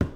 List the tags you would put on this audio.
Sound effects > Objects / House appliances
cleaning clang pour object fill drop foley debris tip scoop clatter bucket slam container carry pail spill plastic shake

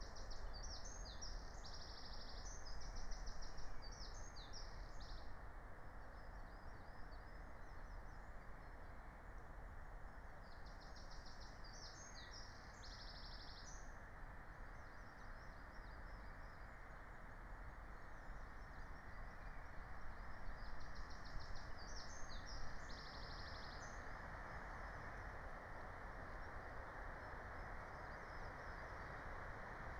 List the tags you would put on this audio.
Soundscapes > Nature
phenological-recording field-recording alice-holt-forest meadow raspberry-pi nature natural-soundscape soundscape

